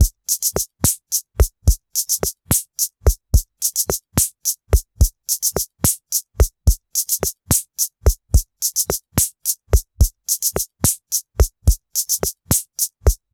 Instrument samples > Percussion
72 Welson Loop 01
Loops and one-shots made using Welson Super-Matic Drum Machine